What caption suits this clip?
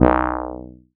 Instrument samples > Synths / Electronic
MEOWBASS 1 Eb
additive-synthesis, bass, fm-synthesis